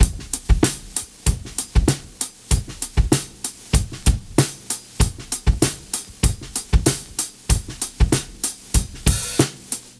Music > Solo percussion
bb drum break loop tut1 96
A short set of Acoustic Breakbeats recorded and processed on tape. All at 96BPM
Breakbeat, Acoustic, Drums, Break, Dusty, DrumLoop, Lo-Fi, Vinyl, Drum-Set, 96BPM, Drum, Vintage